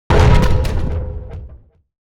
Sound effects > Other
Sound Design Elements Impact SFX PS 079
blunt, collision, game, heavy, hit, impact, shockwave